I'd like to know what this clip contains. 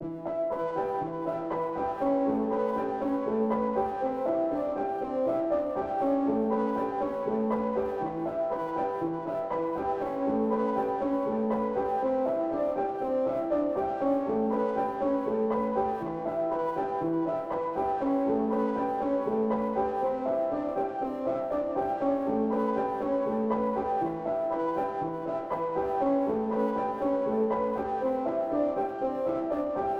Music > Solo instrument
Piano loops 190 efect 3 octave long loop 120 bpm
120, 120bpm, free, loop, music, piano, pianomusic, reverb, samples, simple, simplesamples